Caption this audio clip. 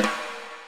Music > Solo percussion
Snare Processed - Oneshot 144 - 14 by 6.5 inch Brass Ludwig
ludwig, rim, drum, brass, perc, reverb, hit, drumkit, snares, percussion, realdrum, crack, sfx, hits, roll, drums, fx, processed, flam, beat, snareroll, oneshot, snare, acoustic, kit, rimshot, snaredrum, rimshots, realdrums